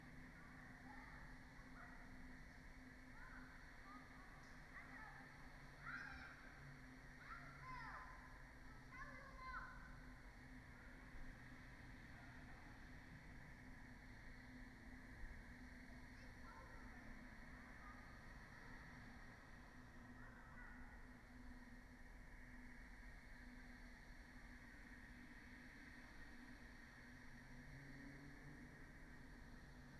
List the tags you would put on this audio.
Soundscapes > Nature
alice-holt-forest
data-to-sound
field-recording
modified-soundscape
nature
phenological-recording
soundscape
weather-data

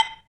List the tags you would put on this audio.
Sound effects > Other
menu
cancel
ui

